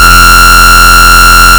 Instrument samples > Percussion
Synthed with phaseplant only. Processed with Khs Distortion, ZL EQ, Waveshaper.